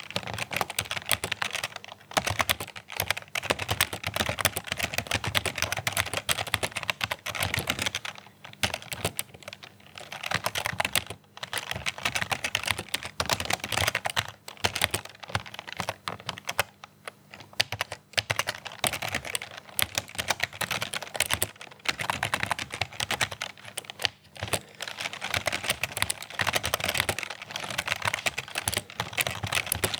Sound effects > Other mechanisms, engines, machines
Keyboard Clicking Sounds
Buttons, Clicking, Computer, Electronic, Keyboard, Typing